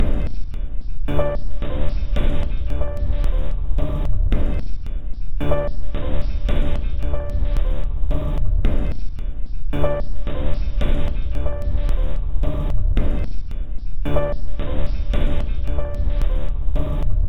Instrument samples > Percussion
This 111bpm Drum Loop is good for composing Industrial/Electronic/Ambient songs or using as soundtrack to a sci-fi/suspense/horror indie game or short film.
Ambient, Dark, Loop, Loopable, Samples, Underground, Weird